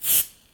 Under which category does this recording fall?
Sound effects > Objects / House appliances